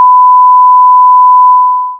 Sound effects > Electronic / Design
two second long beep
Long beep (2 seconds) signaling end of an activity (for example workout).
beep, timerend